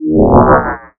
Instrument samples > Synths / Electronic
DISINTEGRATE 1 Eb
bass, additive-synthesis, fm-synthesis